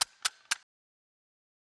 Sound effects > Other mechanisms, engines, machines
Ratchet strap cranking